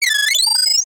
Sound effects > Electronic / Design
Robot screaming
This sound was created and processed in DAW; Using some 'interesting' techniques i was able to create this rather weird patter of different sounds, that, if processed correctly sounds like some robotic thingy or i don't know. Anyways, if people like it i can create a pack with other droid sounds. Enjoy this, and don't forget: Ы.